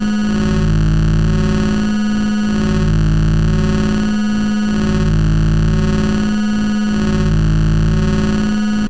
Sound effects > Other mechanisms, engines, machines
IDM Atmosphare7( G# note )
IDM, Industry, Machinery, Noise, Synthetic, Working